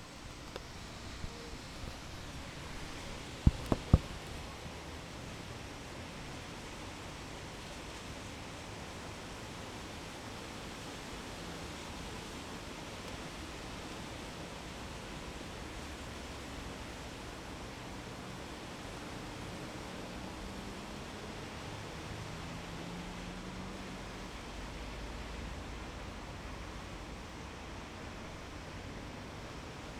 Soundscapes > Urban
traffic hum on a wet and rainy crossing B
Traffic hum at a crossroad night, with wet asphalt and some rain. The wet floor adds noise to the soundscape. Recorded with Zoom H2.